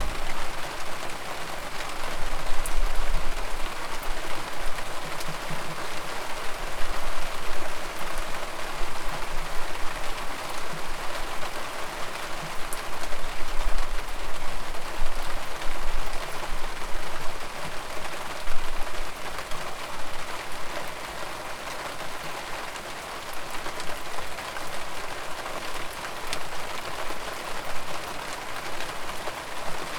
Soundscapes > Nature
Rain falling onto concrete and plastic covers
Rain falling onto concrete and plastic garden furniture covers. Initially heavy, easing off for a bit then coming back for a second heavy burst, tailing off to a alight drizzle with louder drips and splashes. Recorded with Tascam DR-40X.